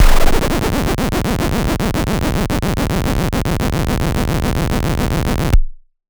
Sound effects > Other
Funny bass
Made in FL Stuio, i love when the bass goes from boom to hahahahahaha
Bass, FLstudio, Funny, SFX